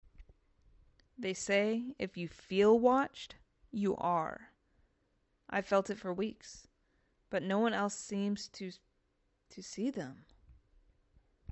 Speech > Solo speech
A creepy hook dealing with paranoia and unseen observers—great for horror or conspiracy narratives.